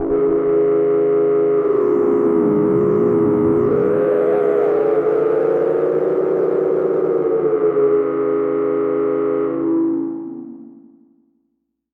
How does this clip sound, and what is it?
Sound effects > Experimental
Analog Bass, Sweeps, and FX-050
trippy, sci-fi, bassy, alien, analog, pad, effect, sfx, electronic, fx, sweep, machine, robotic, robot, mechanical